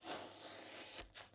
Sound effects > Other
Soft slice vegetable 2
Potato being softly chopped using a Santoku knife in a small kitchen.
Soft, Kitchen, Cooking, Chopping, Vegetable, Chop, Indoor, Cook, Knife, Chef, Chief